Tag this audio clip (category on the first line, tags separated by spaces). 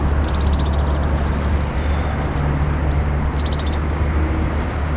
Sound effects > Animals
2025 bird Dare2025-05 H2n Mono morning rivesaltes